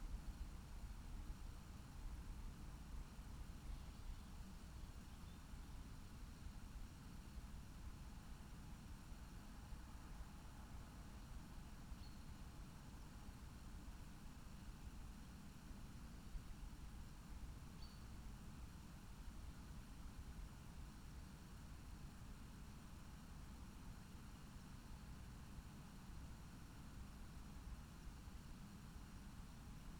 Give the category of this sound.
Soundscapes > Nature